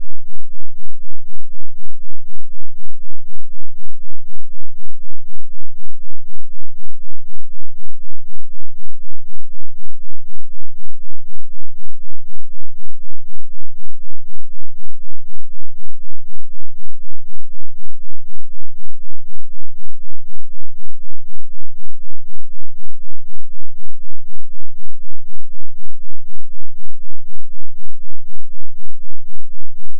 Sound effects > Experimental
Here are the 15 frequencies I did in hertz : 2 Blue 5 Blue 10 Blue 30 Blue 80 Blue 150 Turquoise 300 Blue tinted green. 500 Green 1000 Slightly yellowish green 2000 Caca d'oie 3000 Yellow with an orange tint 5000 Orange 8000 Deep orange (also, I don't know why it's quieter... Audacity also previewed this as quieter while I generated at the same volume) 10000 Redish orange. 20000 Red